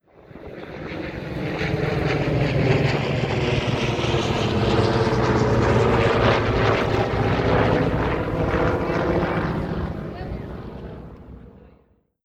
Sound effects > Vehicles
AEROMil-CU Corsair, Skyraider, Two Planes Pass By Nicholas Judy TDC
A Corsair and a Skyraider passing by. Recorded at the Military Aviation Museum in Virginia Beach in Summer 2021.